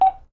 Sound effects > Electronic / Design
BEEP-Blue Snowball Microphone High Pitched Nicholas Judy TDC
A high-pitched beep.
beep, Blue-brand, Blue-Snowball, high-pitched, sci-fi